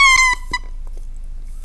Sound effects > Objects / House appliances
mouth foley-005 squeek
mouth sfx recorded with tascam field recorder
beatbox, blow, bubble, bubbles, foley, mouth, perc, sfx, squeek, whistle